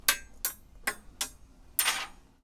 Sound effects > Objects / House appliances
Junkyard Foley and FX Percs (Metal, Clanks, Scrapes, Bangs, Scrap, and Machines) 50
Ambience, Atmosphere, Bang, Bash, Clang, Clank, Dump, dumping, dumpster, Environment, Foley, FX, garbage, Junk, Junkyard, Machine, Metal, Metallic, Perc, Percussion, rattle, Robot, Robotic, rubbish, scrape, SFX, Smash, trash, tube, waste